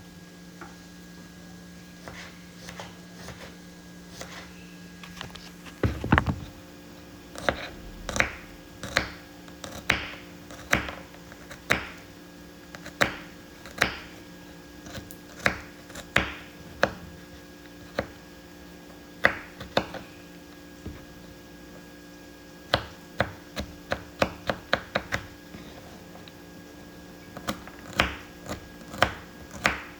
Sound effects > Human sounds and actions
FOODCook onion chop two MPA FCS2
onion chop two
chop, onion, kitchen